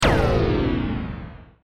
Sound effects > Electronic / Design
Zap sound
A zapping noise made with beepbox. Works great for teleportation, shooting some kind of laser/sci fi weapon, and other sci fi related things.
power, teleport, sci-fi, zap, laser